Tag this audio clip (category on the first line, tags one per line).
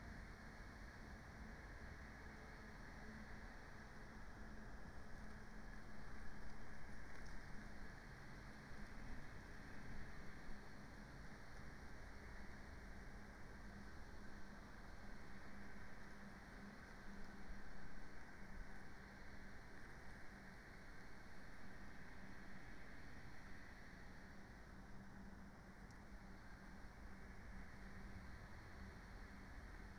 Soundscapes > Nature
raspberry-pi
phenological-recording
weather-data
soundscape
modified-soundscape
field-recording
artistic-intervention
natural-soundscape
sound-installation
Dendrophone
data-to-sound
alice-holt-forest
nature